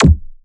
Instrument samples > Percussion
Glitch-Liquid Kick 4
Used Grv Kick 11 from Flstudio. Processed with Waveshaper, Vocodex, ZL EQ, Coda.